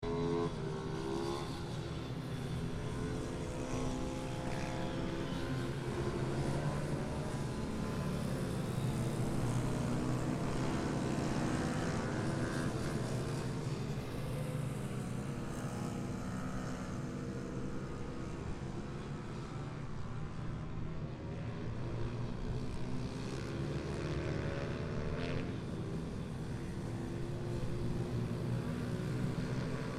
Soundscapes > Other
Supermoto Polish Championship - May 2025 - vol.4 - Racing Circuit "Slomczyn"
Recorded on TASCAM - DR-05X; Field recording on the Slomczyn racetrack near Warsaw, PL; Supermoto Championship;
bikes, racetrack, slomczyn, supermoto, tor, warszawa